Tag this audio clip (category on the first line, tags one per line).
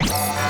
Sound effects > Electronic / Design
digital
glitch
hard
mechanical
pitched
stutter